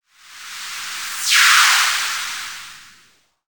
Sound effects > Electronic / Design
FX Radiowavez Riser:Sweep01
High-frequency analog riser with a distorted radio sweep character.